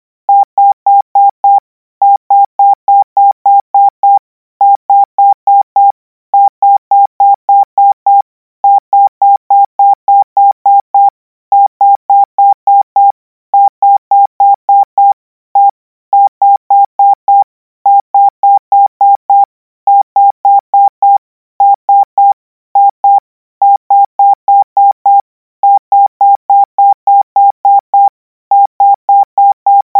Sound effects > Electronic / Design
Koch 08 T - 200 N 25WPM 800Hz 90%
Practice hear letter 'T' use Koch method (practice each letter, symbol, letter separate than combine), 200 word random length, 25 word/minute, 800 Hz, 90% volume.
code, codigo, letters, morse, radio